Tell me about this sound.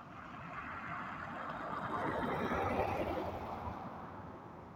Sound effects > Vehicles
car driving by
A car passing by on tarmac
automobile, car, driving, vehicle